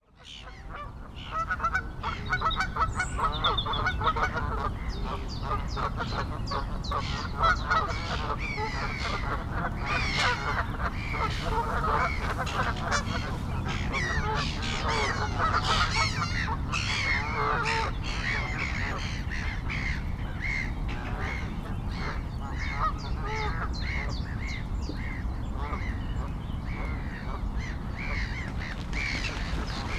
Soundscapes > Nature
An ambience recording at Middleton Lakes, Staffordshire. Day time. Recorded with a Zoom F3 and 2 Em272Z1 Omni directional mics.
birds, field, nature, recording